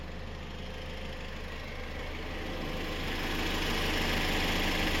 Sound effects > Other mechanisms, engines, machines
clip auto (18)
Auto, Avensis, Toyota